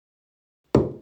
Sound effects > Objects / House appliances
wooden impact
made with fl studio
thud, bang, collide, impact, hit